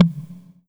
Instrument samples > Percussion
Hyperrealism V9 tom low
synthetic drums processed to sound naturalistic. Note: edited the sustain of the sample